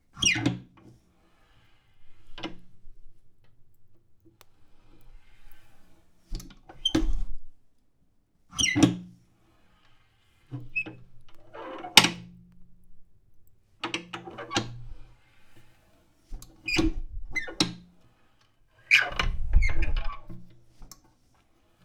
Sound effects > Objects / House appliances
A-B Wooden stove door opening and closing
Subject : An A-B recording of a Wood stove / fireplace door opening and closing. Date YMD : 2025 04 19 Location : Gergueil France. Hardware : Tascam FR-AV2, Rode NT5 in a A-B 17 or 20cm configuration. Weather : Processing : Trimmed and Normalized in Audacity.
2025, A-B, AB, Closing, Door, Fireplace, FR-AV2, indoor, NT5, Opening, Rode, Tasam